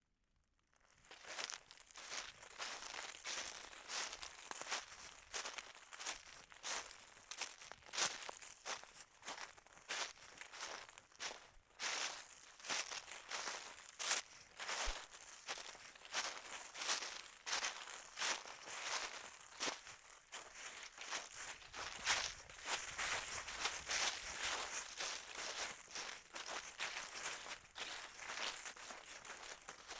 Sound effects > Human sounds and actions
Footsteps through Leaves, Slow to Fast
Footsteps through leaves from a slow walk to a fast pace. Recorded with a ZOOM H6 and a Sennheiser MKE 600 Shotgun Microphone. Go Create!!!
crunchy, footsteps, leaves, nature, steps, walking, woods